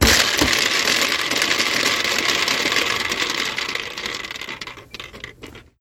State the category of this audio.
Sound effects > Objects / House appliances